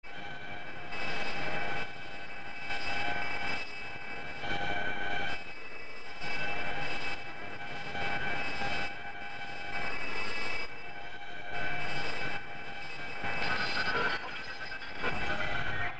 Soundscapes > Synthetic / Artificial
Grain Space 4
glitch, soundscapes, noise, samples, experimental, effects, sample, packs, granulator